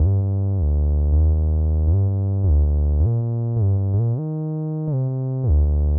Music > Solo instrument
Money Makers Bass - 80BPM G Minor
Synth bassline melody in G harmonic minor at 80 BPM. Made using Vital in Reaper.